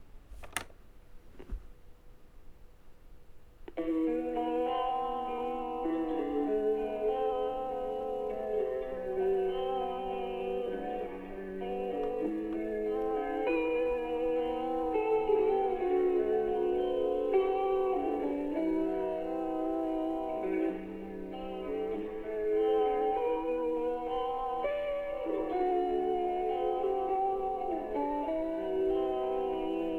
Music > Solo instrument
somber guitar music run through an old tape deck - no drums
A song loop of mine run through a Panasonic RR-830 tape deck. Recorded with a Zoom H5 and processed very minimally in FL Studio. The song playing is entirely my own creation, made from scratch. Reminiscent of an interlude in old Metallica songs. Or Resident Evil / Silent Hill. Extremely warped tape cassette sound, very downtempo and somber. Song itself played on a Fender guitar through an interface. Emotional tape music atmosphere, ambiance.
atmosphere, cassette, guitar, loop, music, rock, room-recording, rr-830, sample, somber, tape